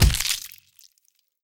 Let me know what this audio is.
Sound effects > Electronic / Design
Skull Split #2
This one features some blood splatter on the tail.